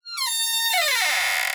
Sound effects > Other mechanisms, engines, machines
Squeaky Hinge
Creaks, Hinge, Squeaky